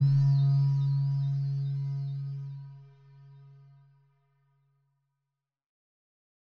Sound effects > Electronic / Design
005 LOW IMPACT
BASSY, DEEP, HIT, IMPACT, PUNCH, RATTLING, RUMBLE